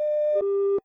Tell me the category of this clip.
Sound effects > Other mechanisms, engines, machines